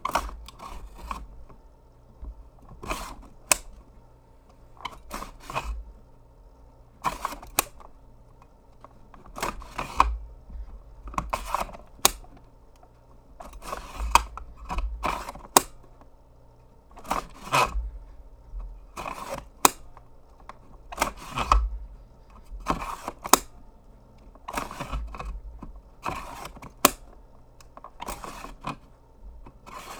Sound effects > Objects / House appliances
A Kodak M4 Instamatic movie camera cover with latch button sliding.